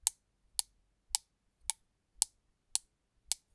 Sound effects > Objects / House appliances
Subject : Two pencils tapping Date YMD : 2025 04 20 Location : Gergueil France. Hardware : Zoom H2n Mid mic. Weather : Processing : Trimmed and Normalized in Audacity. Maybe some fade in/out.